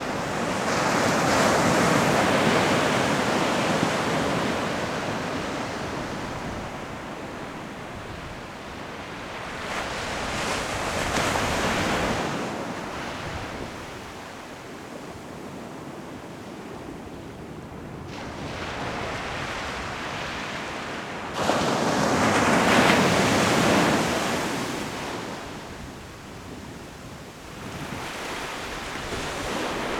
Soundscapes > Nature
beach-day-medium waves-medium wind 1
Recorded on a semi-quiet beach on a sunny winter day. There's a road nearby, a bit of traffic might be heard.
beach, ocean, shore, waves, wind